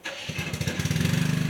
Sound effects > Other mechanisms, engines, machines
Gravel flattener thing (?) starting. Recorded with my phone.